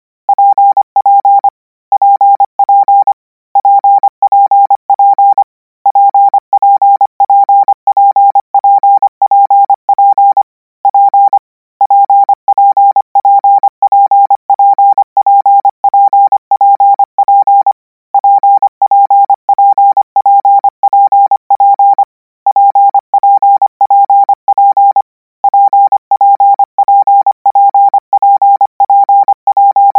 Sound effects > Electronic / Design
Practice hear letter 'P' use Koch method (practice each letter, symbol, letter separate than combine), 200 word random length, 25 word/minute, 800 Hz, 90% volume.